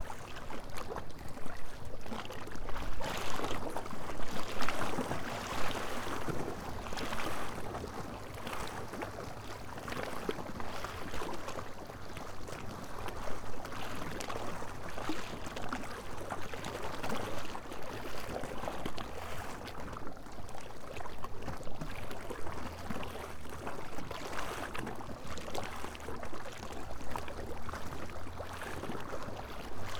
Sound effects > Natural elements and explosions
Weak sea waves hitting a rocky side of the beach